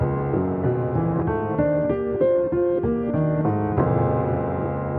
Music > Other
Unpiano Sounds 010
Samples of piano I programmed on a DAW and then applied effects to until they were less piano-ish in their timbre.
Distorted, Distorted-Piano